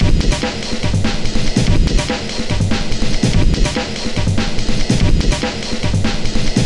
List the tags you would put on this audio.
Instrument samples > Percussion
Alien
Ambient
Dark
Drum
Industrial
Loop
Loopable
Packs
Samples
Soundtrack
Underground
Weird

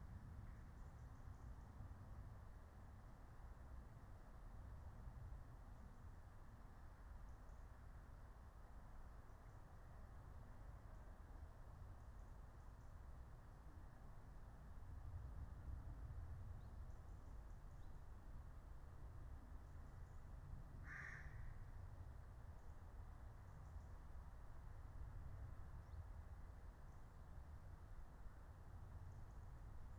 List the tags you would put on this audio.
Soundscapes > Nature
nature
phenological-recording

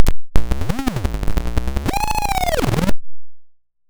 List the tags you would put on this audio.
Sound effects > Electronic / Design
Alien
Analog
Bass
Digital
DIY
Dub
Electro
Electronic
Experimental
FX
Glitch
Glitchy
Handmadeelectronic
Infiltrator
Instrument
Noise
noisey
Optical
Otherworldly
Robot
Robotic
Sci-fi
Scifi
SFX
Spacey
Sweep
Synth
Theremin
Theremins
Trippy

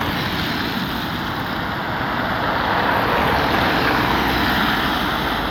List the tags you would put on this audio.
Soundscapes > Urban
car
vehicle
engine

